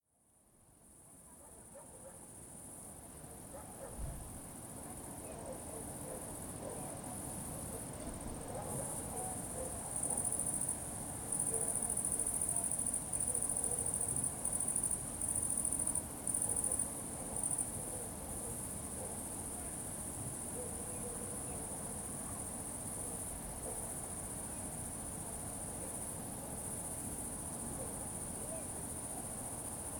Sound effects > Animals
1st of July 2025 evening sounds, crickets distant dogs recorded by SONY ICD-UX512 stereo dictaphone.